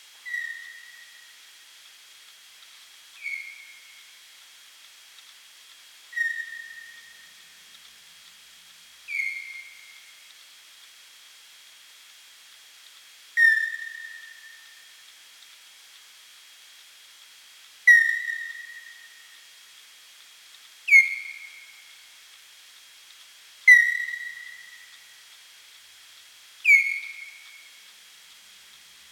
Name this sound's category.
Soundscapes > Nature